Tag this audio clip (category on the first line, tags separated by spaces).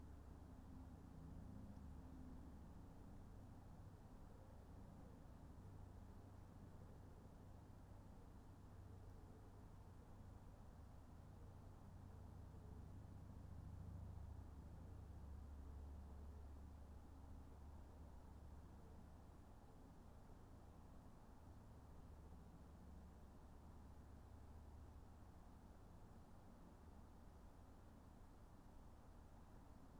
Soundscapes > Nature
sound-installation raspberry-pi Dendrophone soundscape modified-soundscape weather-data alice-holt-forest artistic-intervention natural-soundscape field-recording phenological-recording nature data-to-sound